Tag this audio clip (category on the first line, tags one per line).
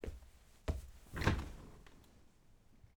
Sound effects > Objects / House appliances
furniture
falling
human
sofa
movement
home